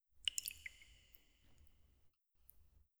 Sound effects > Natural elements and explosions
Wet water 01
Recorded sound of drops recorded while making some green tea. Tascam DR-05.
drip, drop, reverb, splash, tascamDR05, water, wet